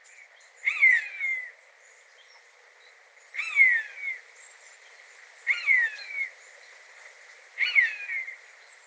Soundscapes > Nature
A recording of a Common Buzzard and a Blue Tit from a Samsung phone. Edited in Rx11.